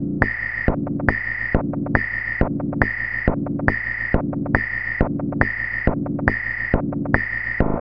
Sound effects > Experimental
Shots-TreeArpBang-03
An aeolian harp made between two trees in my garden.
aeolian, sustain, string